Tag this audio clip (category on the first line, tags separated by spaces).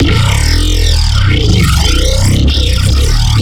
Instrument samples > Synths / Electronic
drop bass dubstep edm